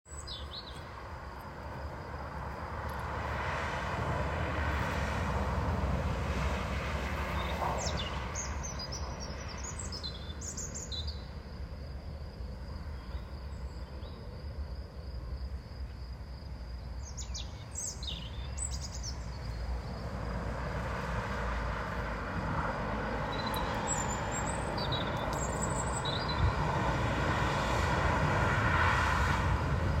Soundscapes > Urban
Date and Time: 29th april, 2025 at 7:41 pm Location: Moreira do Lima, Ponte de Lima Sound type: Keynote sound – urban/artificial that crosses a rural environment, is present in a constant way shapes the rural sound environment. Type of microphone used: Iphone 14 omnidirectional internal microphone (Dicafone was the application used) Distance from sound sources: I recorded over the tunnel where the highway passes and caught the moving cars, as it is a dense sound I think I caught the sound within a radius of 15/20 meters.
car, freeway, traffic